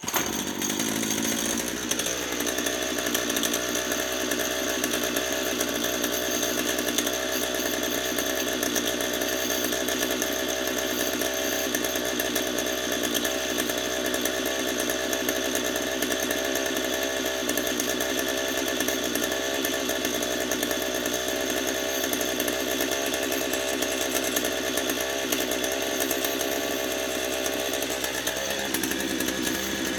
Sound effects > Other mechanisms, engines, machines
saw5testrun
A different Partner 351 saw (hence the filename) that i had rebuilt the carburetor on and spent two days troubleshooting why it wouldn't idle. In this audio clip you can hear me hammering the throttle and the engine violently protesting and stalling at one point. One of the first revs goes up to ~12k RPM so you're welcome. (God forbid any higher it may be running very lean) The advantage of this clip over any of my saw4* clips is that this one does not yet have the bar and chain attached, therefore only pure engine noises! (except the clutch which just keeps spinning at one point) Recorded with my phone.